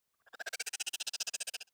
Sound effects > Animals
Insect Animal Growl Chitter
A strange chitter sound. This is actually the sound of a guinea pig being defensive, but reversed.
animal, creature, growl, insect, monster, roar